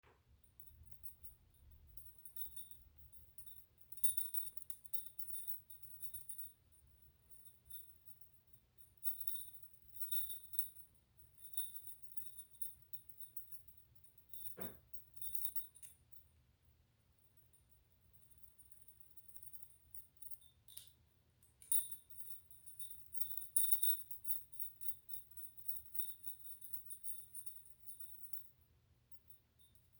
Sound effects > Objects / House appliances
The clinging and jingling of keys.